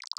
Instrument samples > Percussion
Organic-Water Snap 10.1
This snap synthed with phaseplant granular, and used samples from bandlab's ''FO-REAL-BEATZ-TRENCH-BEATS'' sample pack. Processed with multiple ''Khs phaser'' and Vocodex, ZL EQ, Fruity Limiter. Enjoy your ''water'' music day!
Organic, Glitch, Botanical, Snap, EDM